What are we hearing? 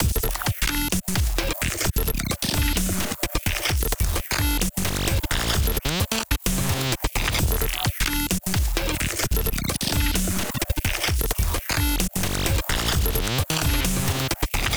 Music > Other
A designed glitch loop created in Reaper with a bunch of VST's.